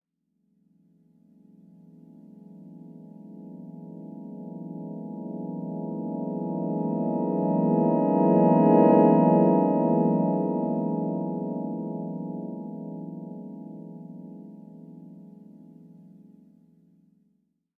Sound effects > Electronic / Design
TONAL TRANSITION
air, company, effect, flyby, gaussian, jet, pass-by, sound, swoosh, transision, ui, whoosh